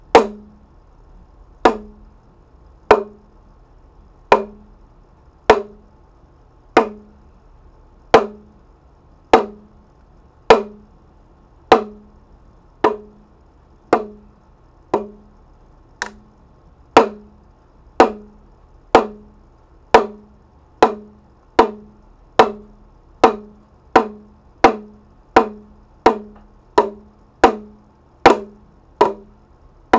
Music > Solo percussion
MUSCPerc-Blue Snowball Microphone, CU Damaroo, Hits Nicholas Judy TDC
Blue-Snowball, damaru, hit, hinduism, hindi, percussion, hindu, tibetan, buddhism, Blue-brand, india, tibet